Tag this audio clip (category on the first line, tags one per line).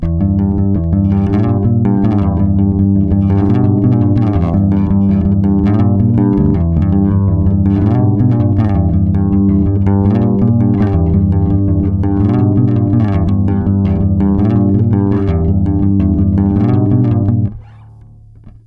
Instrument samples > String
bass
blues
charvel
electric
funk
fx
loop
loops
mellow
oneshots
pluck
plucked
riffs
rock
slide